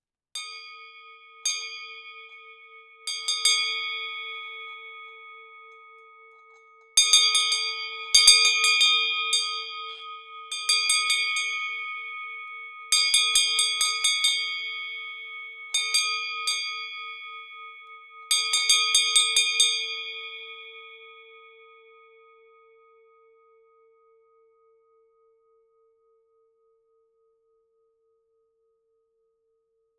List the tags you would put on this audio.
Sound effects > Other
Rode NT5 bell close-up ding

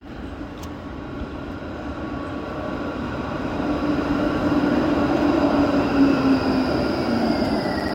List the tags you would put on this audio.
Sound effects > Vehicles
Tampere tram

